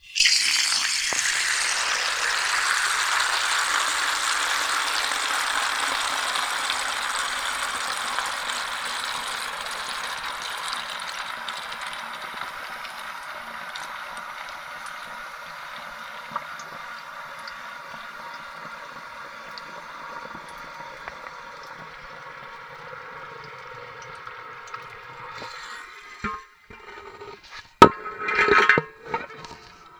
Sound effects > Experimental
contact mic in metal thermos, filling with water quickly2
Water quickly filling a giant metal thermos recorded with a contact microphone.
water-bottle, water, thermos, contact-mic, experimental, contact-microphone